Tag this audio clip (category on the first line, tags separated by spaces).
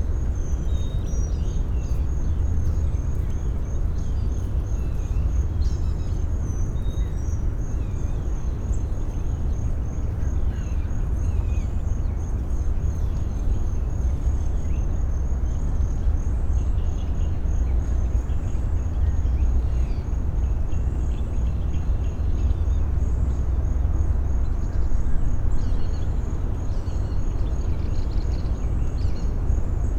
Soundscapes > Urban
birds cemetery distant-traffic field-recording insects morning nature neighborhood summer wind